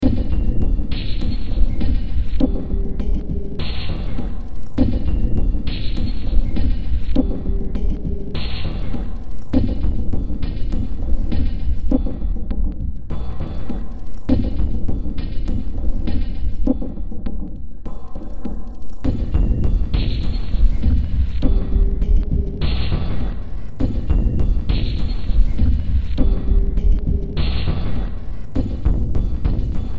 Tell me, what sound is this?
Music > Multiple instruments
Demo Track #3970 (Industraumatic)
Soundtrack, Sci-fi, Games, Industrial, Cyberpunk, Noise, Horror, Ambient, Underground